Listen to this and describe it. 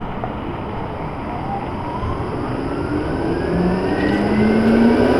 Sound effects > Vehicles
Tram00050664TramDeparting
Tram departing from a nearby stop. Recorded during the winter in an urban environment. Recorded at Tampere, Hervanta. The recording was done using the Rode VideoMic.
field-recording, transportation